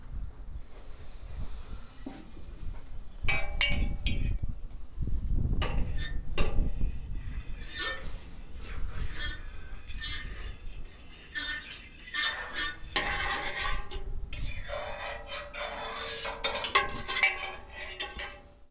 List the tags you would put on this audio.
Sound effects > Objects / House appliances
SFX
garbage
scrape
dumping
FX
Clank
Metal
trash
Environment
dumpster
Foley
Junk
Bang
tube
Perc
Atmosphere
Metallic
Dump
rattle
waste
Bash
Percussion
Clang
Smash
Ambience
Robotic
Machine
rubbish
Robot
Junkyard

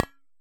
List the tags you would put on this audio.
Sound effects > Objects / House appliances
percusive
sampling

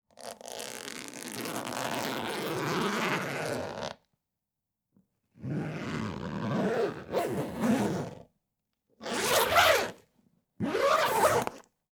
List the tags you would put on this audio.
Sound effects > Objects / House appliances
close
closing
opening
Raquet-bag
unzip
zip
zipper

